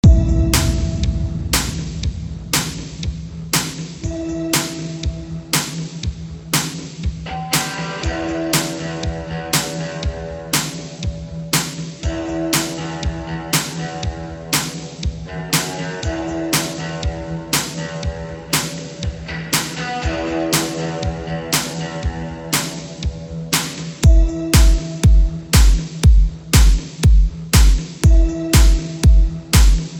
Music > Multiple instruments
Musical Composition A simple composition I made with nexus. This composition is fantastic. Ableton live.
Musical, percs, guitat, percussion-loop, percussive, Composition